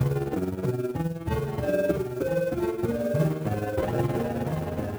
Music > Other
Unpiano Sounds 013
Samples of piano I programmed on a DAW and then applied effects to until they were less piano-ish in their timbre.
Distorted-Piano, Distorted, Piano